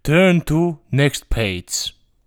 Speech > Solo speech
turn to next page
book, male, man